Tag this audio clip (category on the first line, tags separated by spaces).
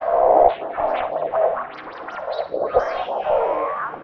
Soundscapes > Synthetic / Artificial
Birdsong LFO massive